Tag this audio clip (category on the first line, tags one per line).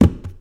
Sound effects > Objects / House appliances
container
clatter
drop
garden
metal
liquid
lid
household
spill
shake
pour
plastic
object
scoop
debris
pail
kitchen
water
knock
carry
tool
fill
cleaning
handle
tip
hollow
foley
bucket
clang
slam